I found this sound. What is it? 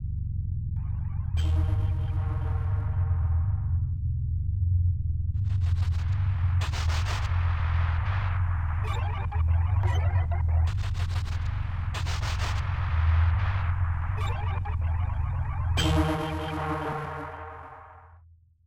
Soundscapes > Synthetic / Artificial
DOnt know what to do with this!